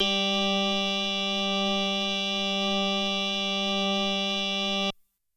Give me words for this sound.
Instrument samples > Synths / Electronic
Synth organ patch created on a Kawai GMega synthesizer. G5 (MIDI 79)
digital, melodic, synthesizer, gmega, strange, patch, synth, kawai, organ, bass, dry